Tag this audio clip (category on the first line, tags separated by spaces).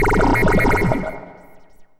Instrument samples > Synths / Electronic
1SHOT BENJOLIN CHIRP DRUM MODULAR NOSIE SYNTH